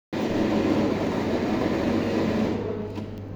Soundscapes > Urban
20240429 0914 motor phone microfone take1
coffe machine motor at IPVC blackbox in Viana do Castelo, captured at 20 centimeters with a phone microfone